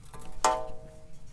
Sound effects > Other mechanisms, engines, machines
Handsaw Oneshot Metal Foley 5
foley, fx, handsaw, hit, household, metal, metallic, perc, percussion, plank, saw, sfx, shop, smack, tool, twang, twangy, vibe, vibration